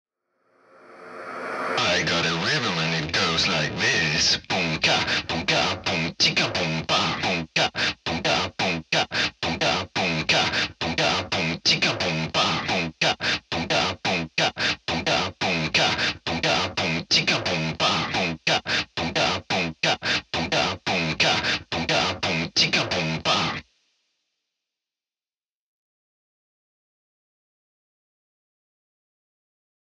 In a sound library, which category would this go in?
Music > Solo percussion